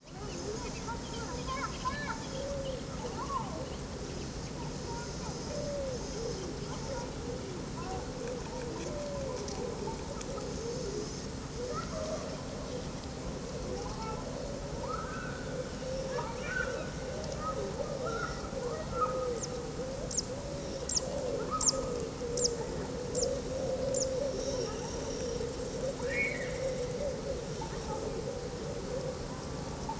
Soundscapes > Nature
la vilaine summer meadow pm5 - kids playing
Large Meadow in a sunny summer afternoon in a swarmy field, near the river "La vilaine". Faraway kids voice playing. Insects as flies and orthoptera, birds are (according to Merlin): wood pigeon, turkish turtle, goldfinch, zitting cisticola, ...), a small shepp's bell, and other discreet presences (frog, buzzard, deer...) Rich and lively ambiance.